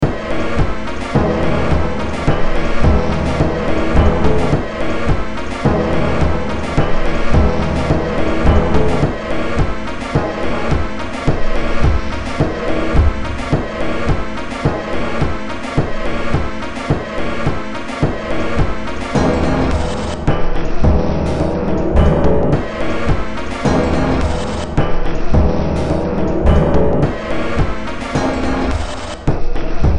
Music > Multiple instruments
Demo Track #3568 (Industraumatic)
Horror, Cyberpunk, Noise, Ambient, Soundtrack, Underground, Industrial, Games, Sci-fi